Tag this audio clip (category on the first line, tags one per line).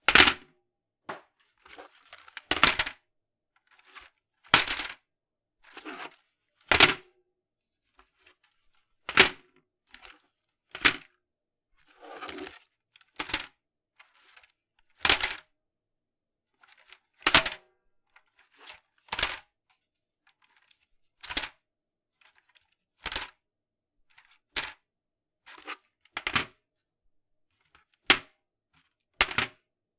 Sound effects > Objects / House appliances
plastic
thud
drop
impact
keyboard